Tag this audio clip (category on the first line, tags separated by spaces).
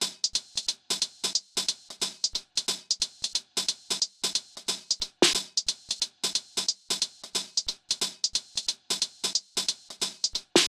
Music > Solo percussion
live-hats loop triplet percussion swing-hats hats closed sample 1lovewav hihat drum-loop